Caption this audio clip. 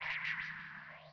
Soundscapes > Synthetic / Artificial
LFO Birdsong 13
Lfo, massive, birds